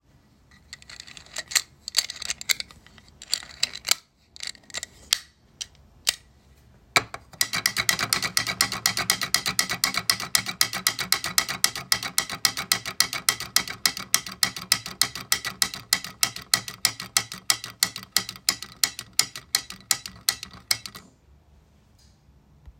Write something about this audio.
Sound effects > Objects / House appliances
Talking Teeth Novelty Toy 2
Novelty Talking Teeth. Sometimes known as "Laughing Teeth". The ubiquitous wind-up chattering novelty toy. This is the sound of the teeth being wound up and then set down on a wooden desktop to yammer away.
mechanism, wind-up